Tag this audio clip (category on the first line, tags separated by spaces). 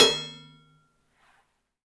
Music > Solo instrument
Drum Drums Cymbals Sabian Oneshot Kit Custom Cymbal Crash GONG Percussion FX Paiste Metal Perc Hat